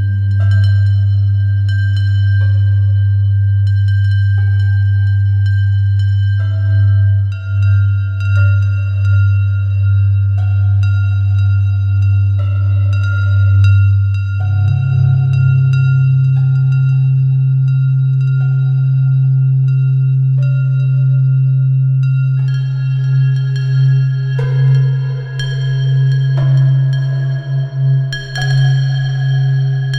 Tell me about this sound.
Soundscapes > Synthetic / Artificial
Light - Ambient Glitching Generative Soundscape
An ambient, generative soundscape built around a slow, low-frequency core. A deep, evolving tone anchors the patch while subtle modulation continuously reshapes its texture, creating a sense of suspended motion. Irregular glitches and fragmented artifacts emerge unpredictably, adding contrast and tension to the otherwise spacious atmosphere. These micro-events are driven by semi-random modulation rather than strict sequencing, so the patch never repeats itself exactly. The result is a minimal, introspective environment — somewhere between drone, noise, and broken rhythm — suitable for long listening sessions, background immersion, or exploratory performance. Once started, the patch is largely self-playing, inviting the listener to drift through its slow transformations and occasional disruptions.